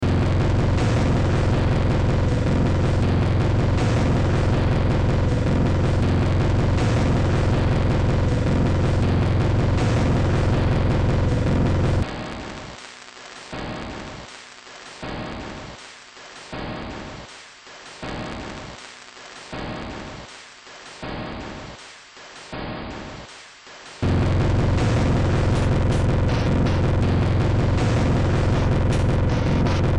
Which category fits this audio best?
Music > Multiple instruments